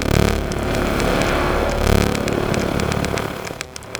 Sound effects > Electronic / Design
Industrial Estate 42
120bpm, Ableton, industrial, chaos, soundtrack